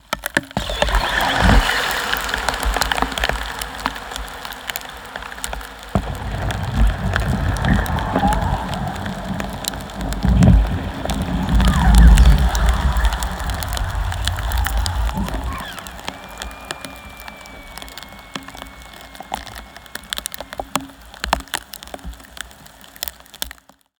Sound effects > Natural elements and explosions
Water Pours on Burning Two by Four To Calm It master
An interesting sound effect I recorded during a woodfire session. Recorded by pouring water onto a burning two by four wood to calm it down. Contact mics captured these internal sounds on the outer edge of the wood as it burned. Recorded using: Metal Marshmellow Pro contact mic LOM Geofon geophone mic Zoom F3 Field Recorder